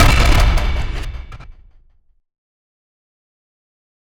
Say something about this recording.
Sound effects > Other
Sound Design Elements Impact SFX PS 112
audio, blunt, cinematic, collision, crash, design, effects, explosion, force, game, hard, heavy, hit, impact, percussive, power, rumble, sfx, sharp, shockwave, smash, sound, strike, thudbang, transient